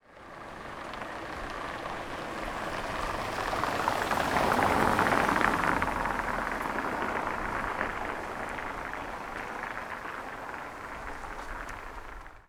Sound effects > Vehicles
Car driving downhill on marble paved road
A car can be heard driving downhill on a marble-paved road. The resulting sound is distinct from that of an asphalt-paved road, as one can clearly hear clearly the "cobblestone" effect of the marble surfaces.
marble, vehicle, driving, paved, road, car